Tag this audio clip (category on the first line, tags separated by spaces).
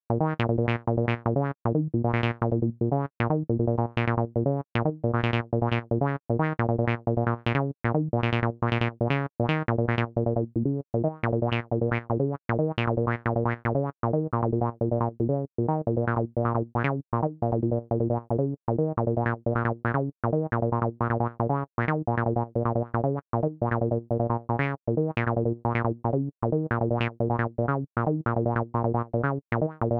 Music > Solo instrument
303 Acid electronic hardware house Recording Roland synth TB-03 techno